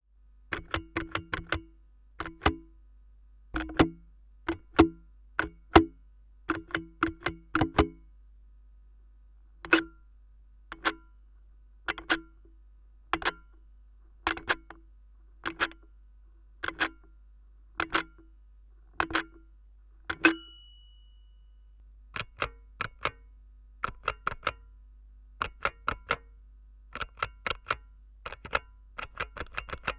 Sound effects > Other mechanisms, engines, machines
Old soviet counting machine - Probably used in pharmacies or hospitals Tascam DR680 Mk2 + AKG C411 + Korg CM300